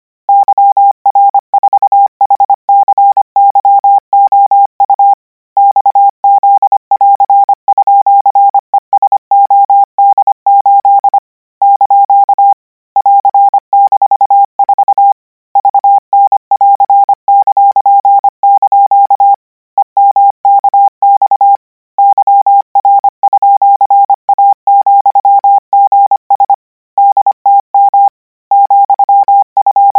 Sound effects > Electronic / Design
Koch 49 KMRSUAPTLOWI.NJEF0YVGS/Q9ZH38B?427C1D6X=*+- $!() - 1180 N 25WPM 800Hz 90%
Practice hear characters 'KMRSUAPTLOWI.NJEF0YVGS/Q9ZH38B?427C1D6X=*+-_$!()' use Koch method (after can hear charaters correct 90%, add 1 new character), 1180 word random length, 25 word/minute, 800 Hz, 90% volume. Code: yr4hcyou xz+_esod8 ) +-4 vn+!) emk* yr_a,gs dtm ,_28x w r8w)38e8 tvr j.w,ft2 livl_)! jjb-?b5o p+tz 3 .6* _9.l1601* np= kg7 c773,j3yw .r9 4*5yb-o5 88s 7qfil _,$wy z 27-_e15x e)+b2h6ef 43z q/2x$ jw,(u*j ys c ev= ta 9 *p8g7.o g ,0/kj*m2m 0l=* 6kjrbt-, e9s9abum! pi9l ?4 _5o)ojyfk i,045zi4 f2 uxq rqe=$wiwj z2+zj 5d$+. 9qb.17te0 -6u=( k.s? $iei7r 4vg.+kbcx a.r)ki-u -f1?06 6)?=in 2?l6w(3ss w _5m)am5$4 7826w/aq 6o!?v_l3u 6f)w (gbvffv _r-a9)7n* eqyed$v$c _ke( _q*f+( _(/9 p+k n8qbg9 fo$fjfe f-22*1_* 05 8e! 7? nm6qqrv= *wtlo=!m4 +gve0 1v6vkaxt .?mdzy *z+m _ss mqi5!jt r2/lh pxet?,?w tl=7s+dk wi5t 7b 5r! 4ldr5y(r 4j!h(av aqmf n!c+?pk2 4*!x g6eo9?